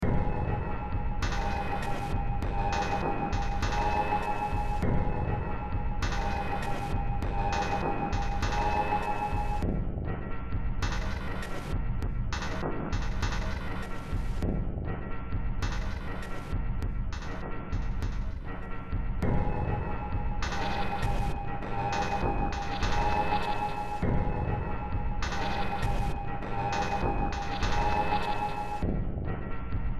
Music > Multiple instruments
Demo Track #3205 (Industraumatic)
Noise, Underground, Sci-fi, Cyberpunk, Industrial, Ambient, Horror, Games, Soundtrack